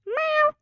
Sound effects > Animals
Cat Meow #3
Cat sound I made for a videogame, shout out to my girlfriend for voicing it. Pitch shifted a little.